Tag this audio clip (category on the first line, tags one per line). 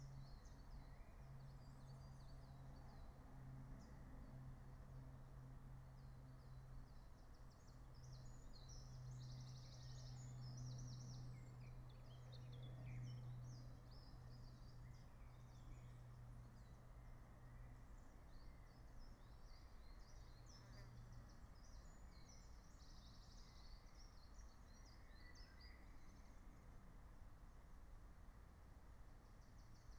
Soundscapes > Nature
soundscape
nature
phenological-recording
natural-soundscape
raspberry-pi
field-recording
alice-holt-forest
meadow